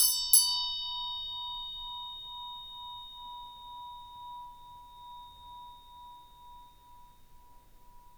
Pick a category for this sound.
Instrument samples > Other